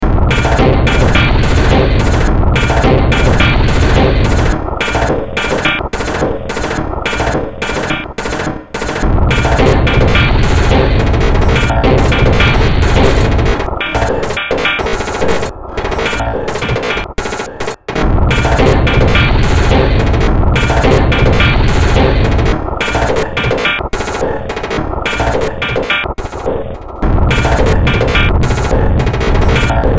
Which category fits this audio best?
Music > Multiple instruments